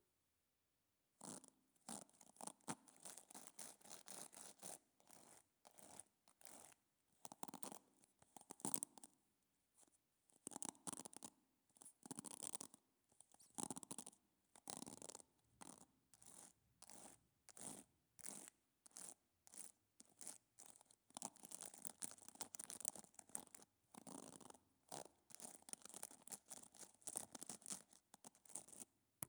Sound effects > Experimental
OBJECTSFashion hair brush scratching hard dense NMRV FSC2
scratching and squeaking a hairbrush with multiple sounds of the brush hard plastic and dense
Continuos, hairbrush, Scratching